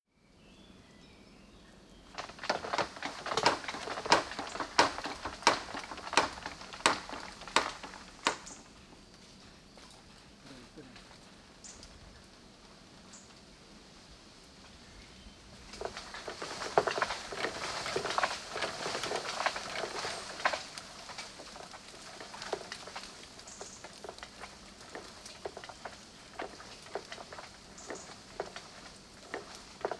Soundscapes > Nature
031 BAMBOO ROCKIN'BAMBOO LEAVES
bamboo, leaves